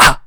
Speech > Solo speech
Short ah!
Very short exhaled voice.